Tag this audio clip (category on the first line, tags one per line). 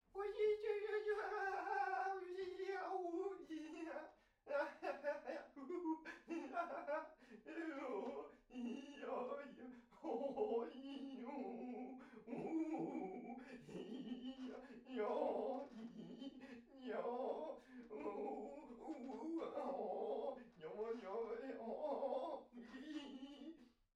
Speech > Other
FR-AV2
indoor
Laughing
Mumble
mumbling
mumbo
NT5
Rode
solo-crowd
Tascam
unintelligible
XY